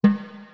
Music > Solo percussion
Snare Processed - Oneshot 84 - 14 by 6.5 inch Brass Ludwig
drums, hit, rimshot, roll, sfx, snaredrum, snareroll, snares